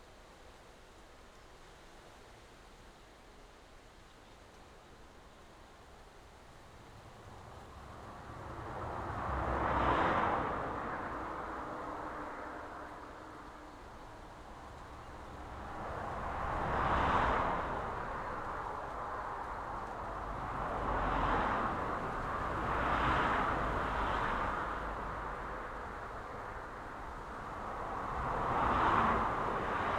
Soundscapes > Urban
Exterior ambience. Windy. Close to a busy road. AT BP4025 (X/Y) (inside Rycote's BBG with fur) into Sound Devices 302 into Sony M10 (Line input). Would be useful if Low pass EQ filter to remove the plants moved by the wind. ··························· Ambiente exterior. Soplaba viento. Cerca de una carretera concurrida. AT BP4025 (X/Y) (dentro del BBG de Rycote, con el pelo) al Sound Devices 302 al Sony M10 (Entrada de Línea). Quizás sirva con un Filtro pasa bajos para quitar la vegetación que mueve el viento.